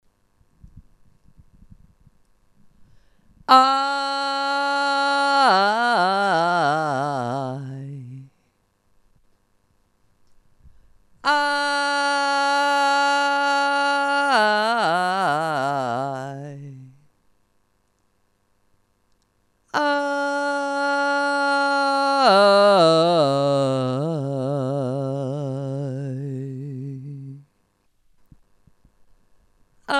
Sound effects > Experimental
I -voice samle fx
For free. Singing samle on the word "I"- if you wish more, please conatc me over email. Thank you!!!!! Roses
girl, voice, vocal, female, samle, singing